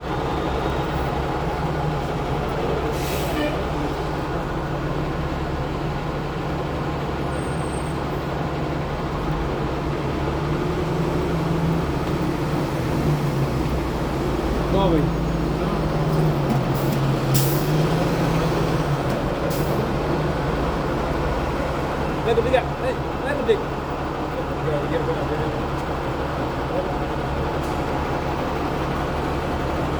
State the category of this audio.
Soundscapes > Urban